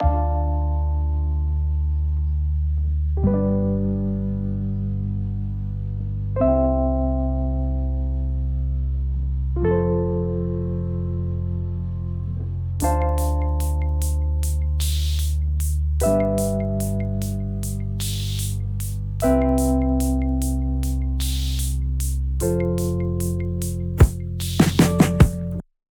Music > Multiple instruments
cool daft punk synth. Part of a whole beat. AI generated: (Suno v4) with the following prompt: generate a sad and chill instrumental inspired in daft punk or something similar, that will give room for emotional lyrics, in C major, at 75 bpm.
ai-generated, beat, daft, punk